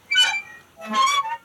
Sound effects > Objects / House appliances
Small metal hatch opening and closing. Recorded with my phone.